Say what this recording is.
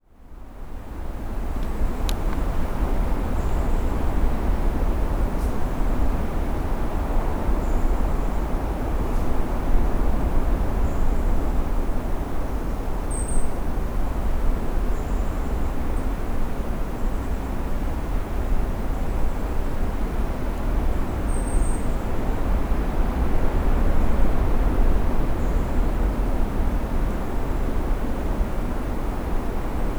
Soundscapes > Nature
Redwoods Ambience with Birds and Distant Ocean Waves Crashing
Ambient Environment with birds, insects, and distant ocean waves crashing recorded in the redwoods coast of northern california recorded on a Tascam D-05 field recorder
Environment, Canyon, River